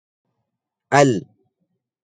Sound effects > Other
lam-sisme
voice, male, arabic, sound, vocal